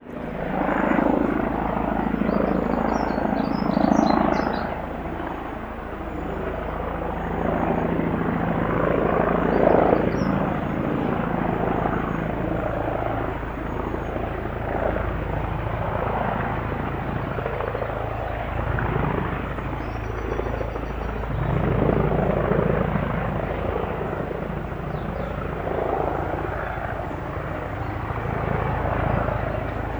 Soundscapes > Other
A recording of an Helicopter circling above when I was at an RSPB site.
birds, gentle, helicopter, outdoors, wind